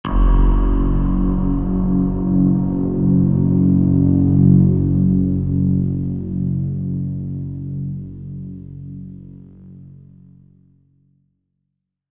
Music > Multiple instruments
I love seeing how people use my work! ----- I'm grateful to the following people whose sounds I used into the creation of this: - "LOOP metal door in wind 959 150329_01" by klankbeeld - "F bass note guitar string" by Vrezerino